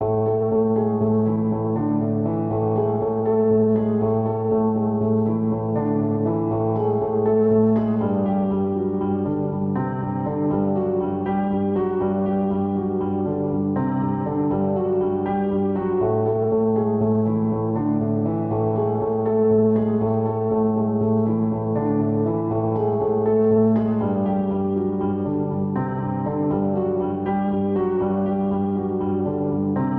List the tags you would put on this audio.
Music > Solo instrument
simplesamples
music
120
samples
free
piano
simple
pianomusic
120bpm
reverb
loop

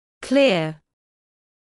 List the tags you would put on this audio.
Speech > Solo speech
word
voice
pronunciation
english

